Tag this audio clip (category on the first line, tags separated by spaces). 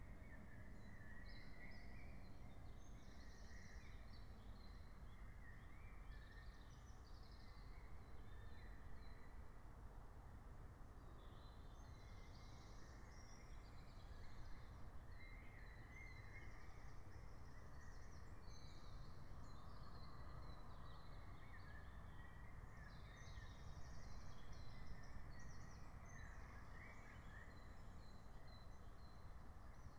Soundscapes > Nature
natural-soundscape soundscape nature field-recording raspberry-pi phenological-recording meadow alice-holt-forest